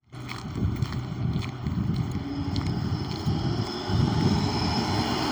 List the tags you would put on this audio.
Sound effects > Vehicles
vehicle
tram
drive

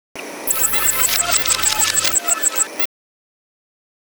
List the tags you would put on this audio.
Sound effects > Experimental
bird
otherworldly
glitch
abstract
insect
rawr

